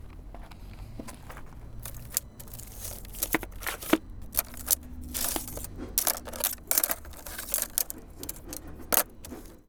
Sound effects > Objects / House appliances
Junkyard Foley and FX Percs (Metal, Clanks, Scrapes, Bangs, Scrap, and Machines) 162
dumping, Clank, rubbish, garbage, Metallic, Atmosphere, Robot, Junk, trash, Percussion, scrape, Ambience, Foley, Clang, Metal, Perc, waste, Robotic, Environment, rattle, Bash, Dump, tube, Bang, Junkyard, dumpster, Machine, Smash, FX, SFX